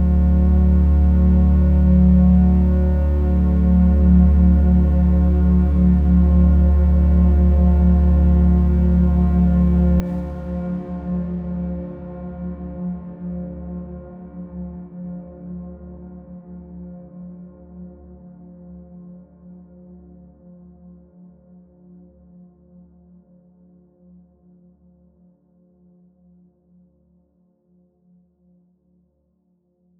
Soundscapes > Synthetic / Artificial
sfx6 = Synthetic Impression of a Massive Engine in Deep Space
Another DIY circuit, recorded and reverberated. This one reminds me of a big engine, still turning, somewhere in a faraway star system.
canyon, droning, engine